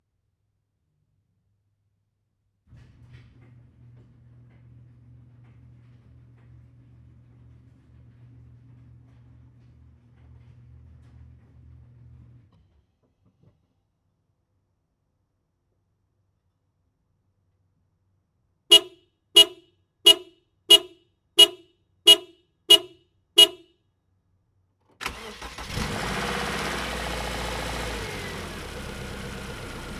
Soundscapes > Other
This is a stereo recording of the engine of a 2013 Subaru 2.5L outback during a drive. MixPre 3ll and two Audio Technica ES943/C microphones were used to record the audio.